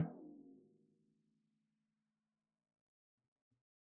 Music > Solo percussion
Med-low Tom - Oneshot 66 12 inch Sonor Force 3007 Maple Rack
recording, loop, drum, drums, acoustic, Tom, beat, maple, drumkit, quality, wood, toms